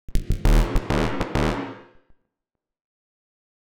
Sound effects > Electronic / Design
Optical Theremin 6 Osc Shaper Infiltrated-012
Alien, Analog, Chaotic, Crazy, DIY, EDM, Electro, Electronic, Experimental, FX, Gliltch, IDM, Impulse, Loopable, Machine, Mechanical, Noise, Oscillator, Otherworldly, Pulse, Robot, Robotic, Saw, SFX, strange, Synth, Theremin, Tone, Weird